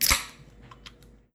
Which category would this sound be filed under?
Sound effects > Objects / House appliances